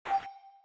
Sound effects > Electronic / Design
UI Accept / Continue / Next /click sound
jump, sound, continue, app, click, game, ux, next, accept, menu, ui